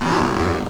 Sound effects > Human sounds and actions
Creaking Floorboards 18
bare-foot, creaking, creaky, floor, floorboard, floorboards, flooring, footstep, footsteps, going, grate, grind, groan, hardwood, heavy, old, old-building, room, rub, scrape, screech, squeak, squeaking, squeaky, squeal, walk, walking, weight, wood, wooden